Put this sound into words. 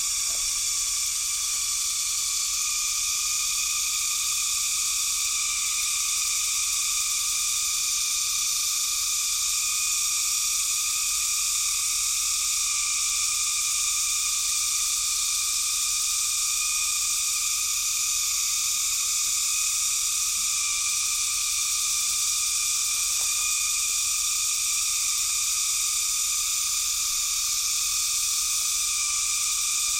Soundscapes > Nature
Cicadas in Erawan Forest, Thailand (Feb 25, 2019)
Recording of intense cicada chorus in the forest of Erawan, Thailand. High-pitched insect sounds.